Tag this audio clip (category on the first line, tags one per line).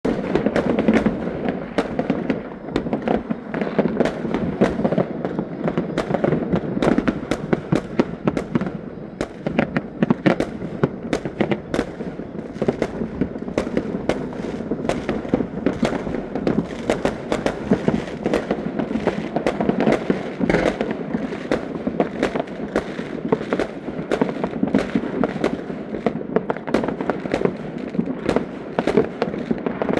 Soundscapes > Urban
2026
FIRECRACKS
FIREWORKS